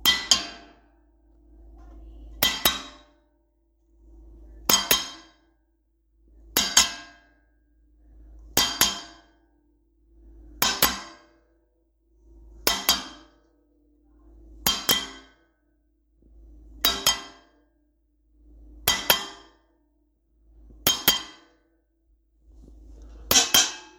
Sound effects > Objects / House appliances
METLImpt-Samsung Galaxy Smartphone, CU Frying Pan, Two, Quick Bangs, Tent Stake, Metal Clank Nicholas Judy TDC
Two, quick frying pan bangs, tent stake or metal clanks.
bang, clank, frying-pan, metal, Phone-recording, quick, stake, tent